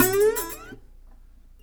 Music > Solo instrument
acoustic guitar slide3
acosutic, chords, riff, dissonant, instrument, pretty, strings, string, guitar, solo, chord, slap, knock, twang